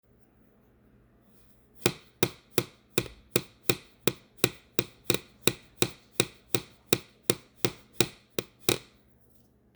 Sound effects > Objects / House appliances
Pen tapping 1
pen tapping on a desk